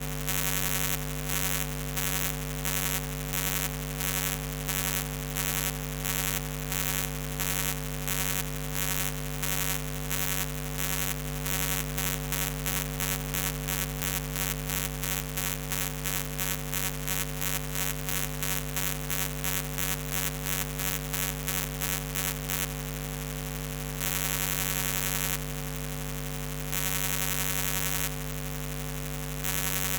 Soundscapes > Other
Light Pattern
Infra red recording of lights, recorded on zoom h2n.